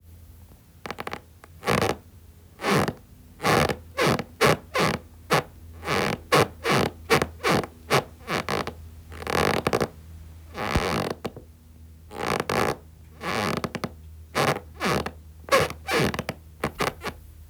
Sound effects > Human sounds and actions
The creaky floors in my bedroom.
Creak, floorboard, loud, old-house